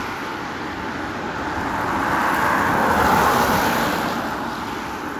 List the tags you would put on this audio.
Soundscapes > Urban
Car Drive-by field-recording

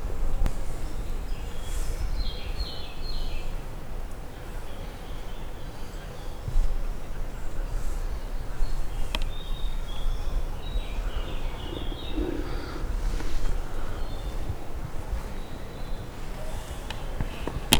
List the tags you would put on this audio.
Soundscapes > Nature
Collserola Rossinyol Nice Nature

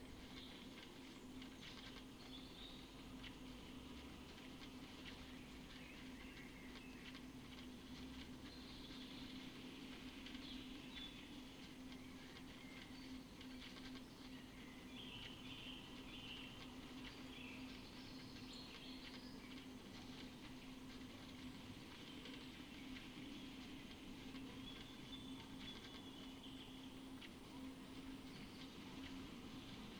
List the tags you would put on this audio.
Soundscapes > Nature
nature modified-soundscape soundscape field-recording alice-holt-forest phenological-recording raspberry-pi artistic-intervention natural-soundscape Dendrophone data-to-sound sound-installation weather-data

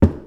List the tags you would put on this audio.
Sound effects > Objects / House appliances
tool tip household clang scoop drop plastic kitchen knock bucket carry slam hollow fill container spill lid cleaning foley clatter water pail liquid object handle pour shake metal garden debris

